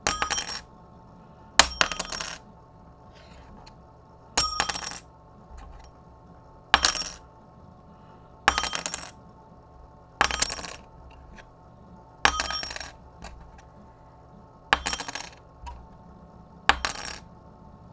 Sound effects > Objects / House appliances
METLImpt-Blue Snowball Microphone, CU Small, Tin, Drop Nicholas Judy TDC
A small tin object dropping.
Blue-brand, Blue-Snowball, drop, foley, object, small, tin